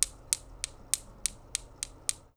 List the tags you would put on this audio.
Music > Solo percussion
Blue-brand Blue-Snowball desktop drums drumsticks hit together

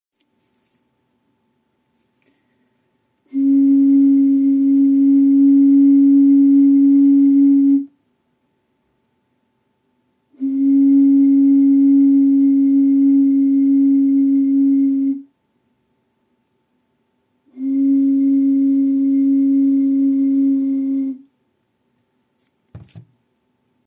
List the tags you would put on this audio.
Instrument samples > Other
lower-pitch intrumental low-pitch calm instrument blow wind low instrumental music lower experimental traditional hum note bottle noise

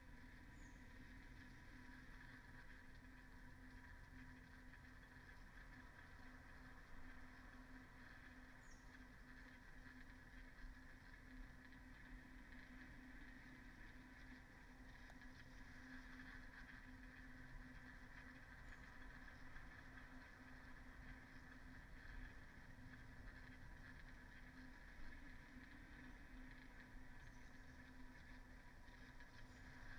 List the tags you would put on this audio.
Soundscapes > Nature
alice-holt-forest artistic-intervention data-to-sound modified-soundscape nature sound-installation soundscape weather-data

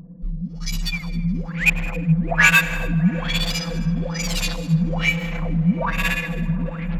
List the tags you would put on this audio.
Sound effects > Electronic / Design
abstract vox digital metal future soundeffect raw processed sfx experimental percussion effect strange sound-design freaky sci-fi fx

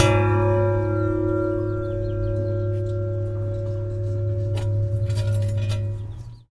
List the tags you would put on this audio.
Sound effects > Objects / House appliances
SFX Clank Foley Environment garbage Smash dumpster tube trash Bang Junkyard FX Robotic Ambience Metal Robot Machine dumping Perc Percussion Metallic Clang Junk rubbish Atmosphere Dump scrape Bash rattle waste

